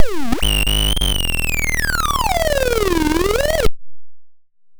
Sound effects > Electronic / Design
Optical Theremin 6 Osc dry-103
FX, Sweep, Synth